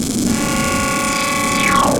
Sound effects > Electronic / Design
Industrial Estate 39
120bpm, Ableton, chaos, industrial, loops, soundtrack, techno